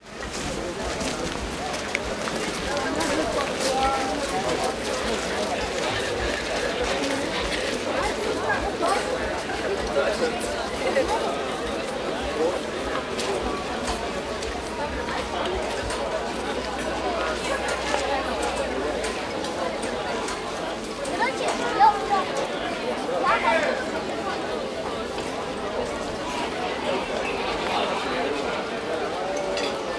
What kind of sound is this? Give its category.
Soundscapes > Nature